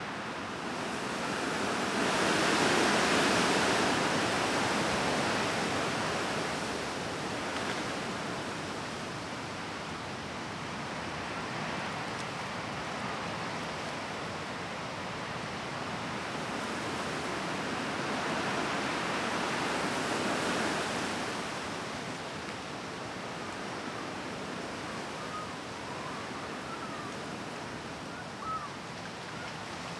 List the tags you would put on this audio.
Soundscapes > Nature
windy-day
gust
wind
trees
windy

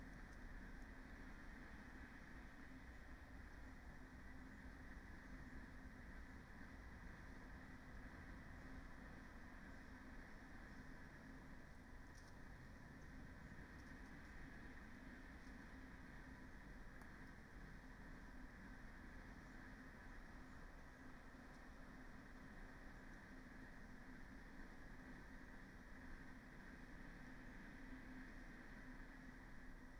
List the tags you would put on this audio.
Soundscapes > Nature
alice-holt-forest
artistic-intervention
data-to-sound
field-recording
modified-soundscape
natural-soundscape
phenological-recording
raspberry-pi
sound-installation
soundscape

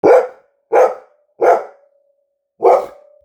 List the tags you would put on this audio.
Sound effects > Animals
heroic
animal
epic
kHz
HD
bark
strong
field
vocalization
dog
48
sound
effect
cinematic
golden
recording
trailer
close-mic
dry
clean
single
retriever

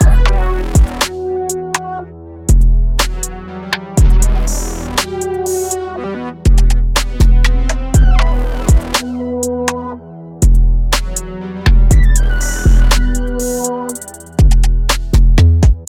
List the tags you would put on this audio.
Music > Multiple instruments
120bpm
ai-generated
starwars
dark
hip
hop
trap

